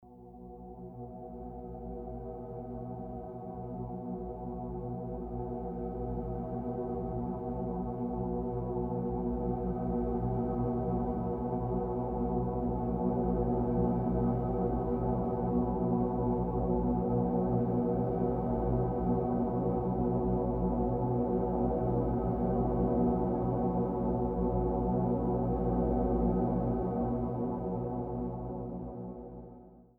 Soundscapes > Other
Dark Tense Ambient Soundscape
A dark and tense ambient soundscape featuring eerie textures and mystical tones. Perfect for thrillers, fantasy scenes, and mysterious cinematic moments.
ambient, atmosphere, background, cinematic, dark, dramatic, eerie, fantasy, film, game, haunting, horror, moody, mysterious, mystical, soundscape, supernatural, suspense, tense, thriller